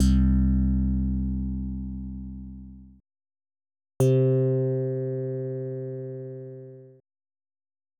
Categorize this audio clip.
Instrument samples > String